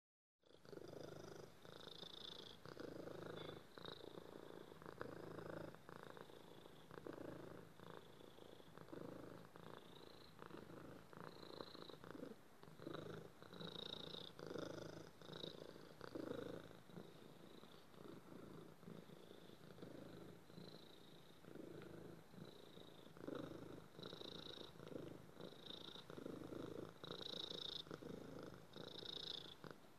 Sound effects > Animals
Cat Purring
A cat is purring happily after dinner
cat, pet, purring, kitty, kitten, purr, pets, cats